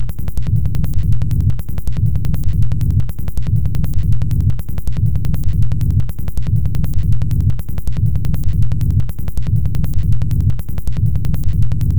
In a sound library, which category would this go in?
Instrument samples > Percussion